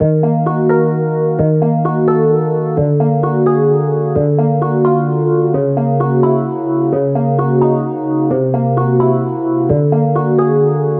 Music > Solo instrument
cutscene music (cookie run)
use this for your fan-made cookie run cutscenes (made with just chords on soundtrap) [also this is not a skit]
game, loop, music